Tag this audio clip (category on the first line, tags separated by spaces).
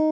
Instrument samples > String
cheap design tone arpeggio stratocaster guitar sound